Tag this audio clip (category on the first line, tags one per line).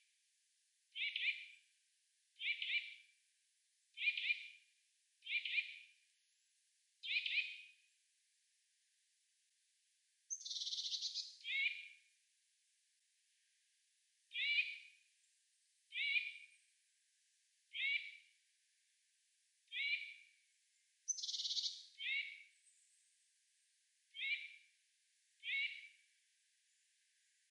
Sound effects > Animals
birds
birdsong
nature
nuthatch